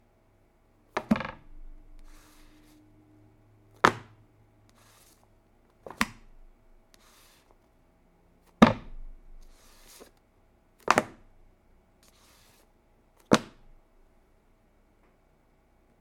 Sound effects > Objects / House appliances
Softcover book hitting table
I drop a softcover book onto a wood table repeatedly
book, drop, hit, smack, softcover, table, wood